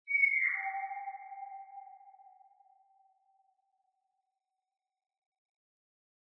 Sound effects > Electronic / Design
It is said to only vocalize at sunset and often uses magic to stay invisible. Beyond that, virtually nothing is known about this enigmatic creature.